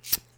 Sound effects > Other
LIGHTER.FLICK.19
Lighter, zippo